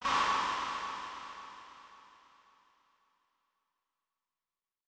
Instrument samples > Percussion
a distorted 909 clap with reverb
clap, drums, percussion